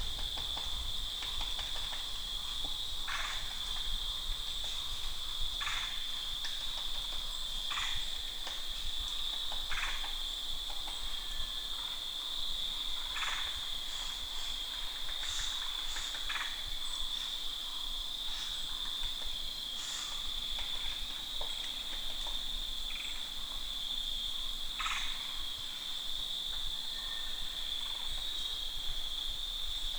Soundscapes > Nature
Afternoon Woods in October - Midwest US (loop)
Sat out in the middle of a nature preserve in northern Indiana with my Clippy EM272 stereo mics plugged into a Tascam X6. You can hear cars and a train in the distance at times but I did some light processing to remove most of it. Loops seamlessly. The leaves are just starting to change colors and fall down when the wind picks up. You can hear chipmunks chirping, squirrels bickering, woodpeckers slowly bonking at the trees, and other birds singing at times all while the crickets and katydids provide a constant ambience.
ambiance, ambience, ambient, autumn, bickering, birds, call, chipmunk, chipmunks, clippy, clippys, crickets, em272, fall, field-recording, forest, katydids, leaves, loop, nature, peck, portacapture, squirrels, tascam, trees, wind, woodpecker, woodpeckers, woods, x6